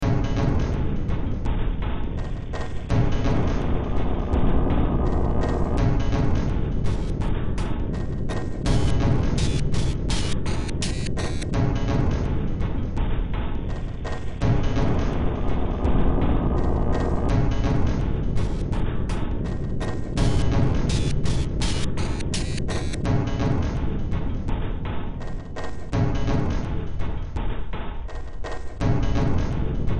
Music > Multiple instruments
Demo Track #3192 (Industraumatic)
Ambient, Cyberpunk, Games, Horror, Industrial, Noise, Sci-fi, Soundtrack, Underground